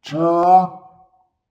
Speech > Solo speech
I don't even know. Recorded in December of 2024.